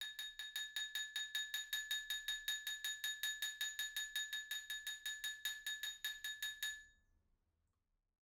Sound effects > Other
Glass applause 22
applause, cling, clinging, FR-AV2, glass, individual, indoor, NT5, person, Rode, single, solo-crowd, stemware, Tascam, wine-glass, XY